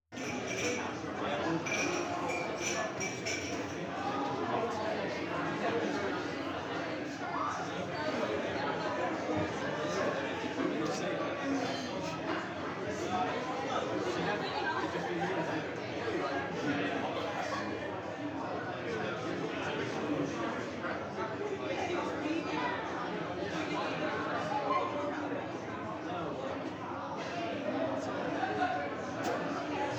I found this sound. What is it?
Soundscapes > Indoors
Bar crowd ambience
the sound of a busy pub or crowd of people, merging of multiple recordings of the interiors of various pubs as to achieve an effect where there is no discernible sound of a conversation.
public-chatter, bar, crowd, people, pub, public